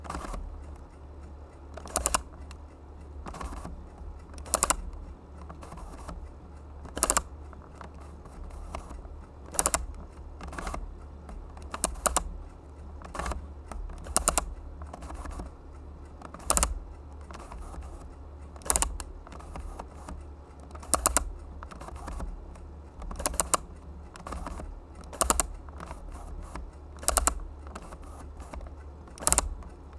Sound effects > Objects / House appliances
COMCam-Blue Snowball Microphone, CU Canon DL 9000, Focus Lens, In, Out Nicholas Judy TDC
A Canon DL-9000 focus lens zooming in and out.
Blue-brand, foley, out, zoom, camera, Blue-Snowball, canon, dl-9000, focus-lens, lens, focus